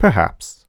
Speech > Solo speech
Doubt - Perhaps 2
NPC, perhaps, Male, Single-take, Voice-acting, skepticism, FR-AV2, oneshot, Mid-20s, skeptic, talk, dialogue, singletake, Man, Neumann, U67, voice, doubt, Video-game, word, Human, Tascam, Vocal